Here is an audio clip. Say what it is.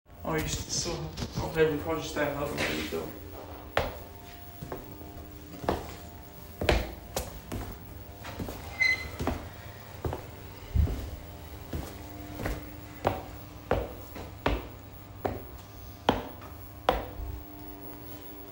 Sound effects > Natural elements and explosions
Walking on stone 2
stone, floor, walk